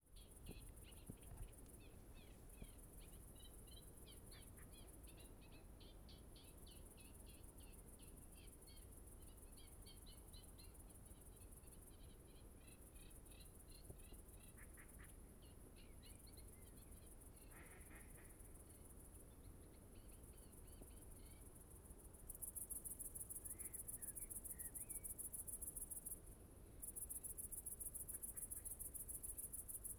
Soundscapes > Nature
Recorded that sound by myself with Recorder H1 Essential